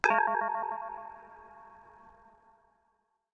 Sound effects > Other
spell dark b
24 - Average Dark Spells Foleyed with a H6 Zoom Recorder, edited in ProTools